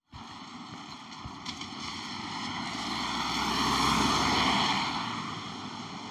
Sound effects > Vehicles
Sound of a car passing by in wet, cool weather, with winter tires on the car. Recorded using a mobile phone microphone, Motorola Moto G73. Recording location: Hervanta, Finland. Recorded for a project assignment in a sound processing course.